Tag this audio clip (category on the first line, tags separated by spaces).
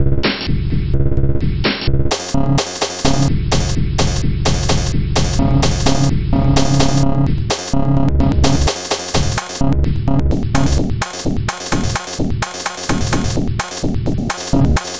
Instrument samples > Percussion
Industrial Soundtrack Loop Alien Loopable Ambient Dark Samples Underground Weird Packs Drum